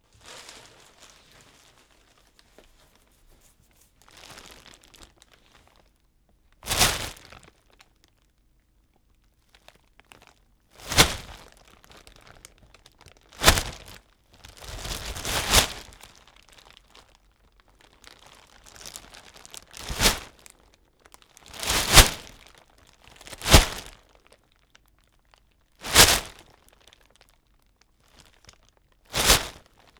Sound effects > Human sounds and actions
RAINCOAT FOLEY AKG-C414 03
Unprocessed, Clean Thin-Sheet plastic polythene poncho Raincoat foley with various movements recorded in studio with an AKG-C414 XL- II condenser microphone (Cardioid Configuration). Recording done in Reaper 7.42. Recording is done very close to the microphone, so you may have to do a highpass and reduce volume for most purposes. Recommended use is to take small bits from the longer sound to suggest movement. Recorded at the World University of Design sound studio, Sonipat, Delhi NCR, India.